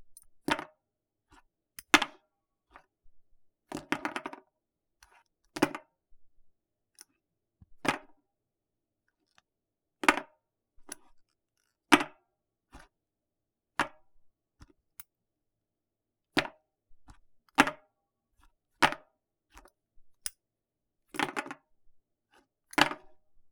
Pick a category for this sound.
Sound effects > Objects / House appliances